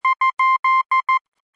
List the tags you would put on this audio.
Sound effects > Electronic / Design
Telegragh Language

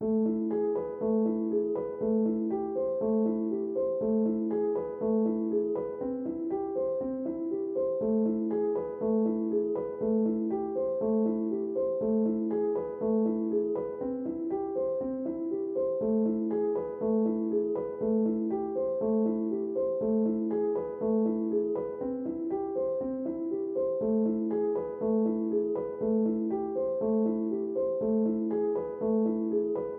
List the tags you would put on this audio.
Music > Solo instrument
simple pianomusic piano free reverb 120bpm samples music simplesamples loop 120

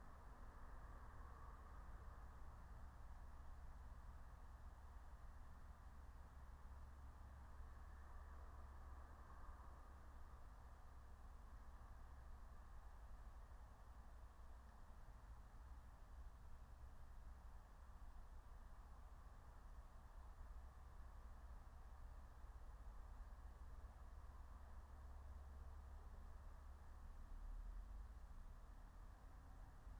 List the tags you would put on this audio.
Soundscapes > Nature
field-recording phenological-recording alice-holt-forest soundscape natural-soundscape nature raspberry-pi meadow

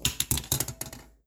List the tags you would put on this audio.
Sound effects > Objects / House appliances
foley drop ice-cream-scooper Phone-recording

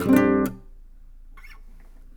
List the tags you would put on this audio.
Music > Solo instrument
acosutic chord chords dissonant guitar instrument knock pretty riff slap solo string strings twang

